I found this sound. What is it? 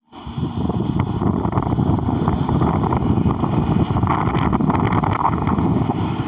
Sound effects > Vehicles
Sounds of a tram in wet, cool, and windy weather. Recorded using a mobile phone microphone, Motorola Moto G73. Recording location: Hervanta, Finland. Recorded for a project assignment in a sound processing course.